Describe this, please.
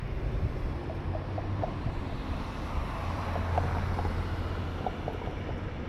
Sound effects > Vehicles
Sound recording of a car passing by and driving over a swinging concrete slab. Recording done next to Hervannan valtaväylä, Hervanta, Finland. Sound recorded with OnePlus 13 phone. Sound was recorded to be used as data for a binary sound classifier (classifying between a tram and a car).
Finland, Field-recording